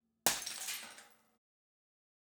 Sound effects > Objects / House appliances
Jar Shatter
Sound of a glass jar that was filled with oatmeal being dropped on my kitchen floor. Needed this sound for a shortfilm and couldn't find any good jar sounds anywhere. Recorded with Oktava MK012 (Hypercardioid capsul) & MOTU M2 Raw with some Some compression & EQ
Break, Crash, Crunch, Glass